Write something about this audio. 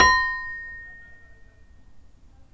Sound effects > Other mechanisms, engines, machines
metal shop foley -054
tink, oneshot, boom, rustle, percussion, fx, bam, metal, perc, pop, tools, sound, wood, knock, strike, shop, bang, crackle, bop, little, thud, foley, sfx